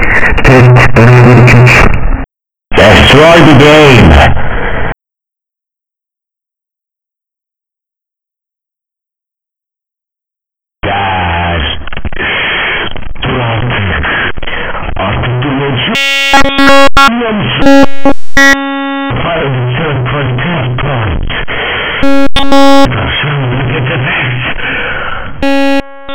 Sound effects > Other
Glitch sounds with lyrics
How do you make your voice sound like mine? First, I use Audacity. Record your voice, then go to the tracks section at the top, click resample, and set the number to 8000 (make sure your recorded voice is selected). Then go to the effects section, click distortion and modulation, and select distortion. Set the output level to 93 and the distortion amount to 82 And voila, And repeat this last step exactly 3 times. your voice will sound like NULL!
old-time-radio, glitch, noise, loud